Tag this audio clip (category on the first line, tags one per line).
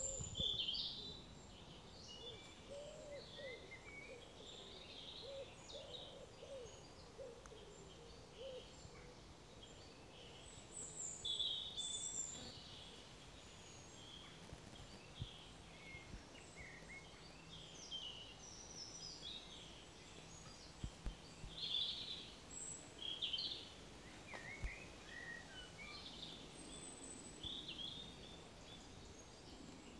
Sound effects > Animals
Bird
Birdsong
Singing
Woodland